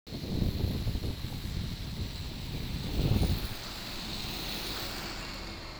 Sound effects > Vehicles
tampere bus3
vehicle, transportation, bus